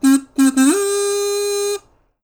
Music > Solo instrument
MUSCInst-Blue Snowball Microphone, CU Kazoo, Fanfare Nicholas Judy TDC
A kazoo fanfare.
Blue-brand, Blue-Snowball, cartoon, fanfare, kazoo